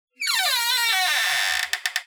Sound effects > Other mechanisms, engines, machines
Squeaky Hinge
Squeaky, Creaks, Hinge